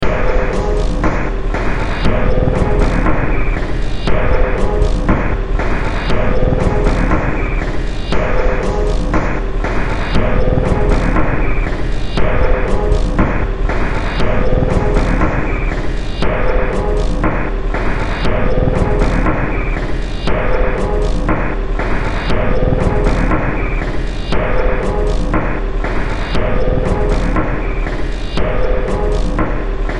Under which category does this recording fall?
Music > Multiple instruments